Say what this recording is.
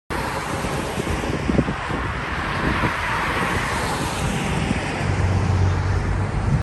Sound effects > Vehicles
Sun Dec 21 2025 (12)
car, highway, road